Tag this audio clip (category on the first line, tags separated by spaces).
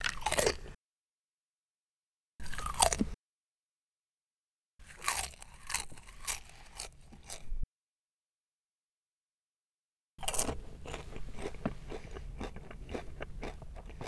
Sound effects > Objects / House appliances
bite chew crunch eat munch